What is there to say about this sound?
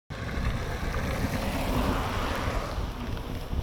Sound effects > Vehicles
Car 2025-11-02 klo 13.27.55
Sound recording of a car passing by in windy conditions. Recording done next to Hervannan valtaväylä, Hervanta, Finland. Sound recorded with OnePlus 13 phone. Sound was recorded to be used as data for a binary sound classifier (classifying between a tram and a car).
Car, Field-recording, Finland